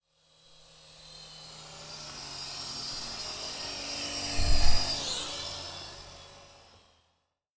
Sound effects > Objects / House appliances
AERORadio-Blue Snowball Microphone, CU Helicopter, RC, Fly By Nicholas Judy TDC
An RC helicopter flying by.